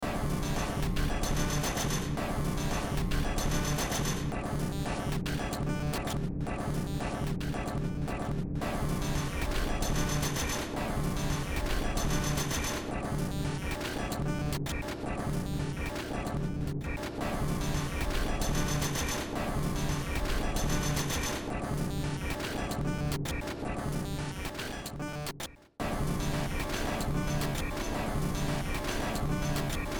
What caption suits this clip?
Music > Multiple instruments
Short Track #3774 (Industraumatic)
Underground, Ambient, Industrial, Horror, Games, Sci-fi, Soundtrack